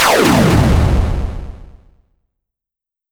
Sound effects > Electronic / Design
Synth Hit 1
A simple synth hit sound made in Audacity from heavily editing a sine wave, square wave, and sawtooth wave.
digital, echo, effect, electronic, fx, hit, noise, sfx, sound-design, sounddesign, sound-effect, soundeffect, synth